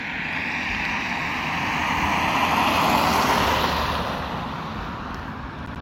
Soundscapes > Urban
auto6 copy
The car driving by was recorded In Tampere, Hervanta. The sound file contains a sound of car driving by. I used an Iphone 14 to record this sound. It can be used for sound processing applications and projects for example.
car, traffic